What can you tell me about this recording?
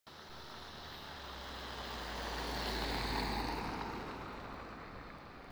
Sound effects > Vehicles
tampere car1
automobile, car